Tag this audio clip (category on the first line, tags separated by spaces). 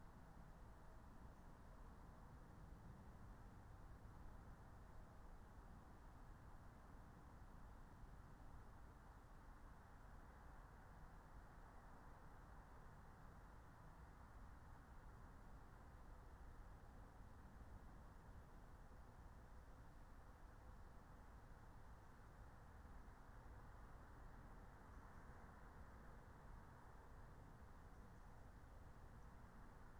Soundscapes > Nature
field-recording meadow soundscape nature raspberry-pi natural-soundscape alice-holt-forest phenological-recording